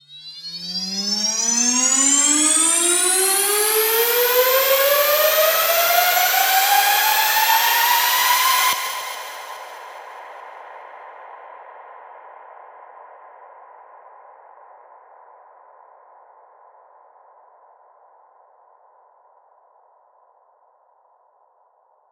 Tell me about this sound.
Sound effects > Electronic / Design
BIG RISER
A classic electronic music transition effect known as a 'riser.' It consists of a synthesized upward frequency sweep that builds in pitch and intensity, creating anticipation before a potential drop or transition.
electronic, fx, riser, sound-design, sound-effect, synthetic, transition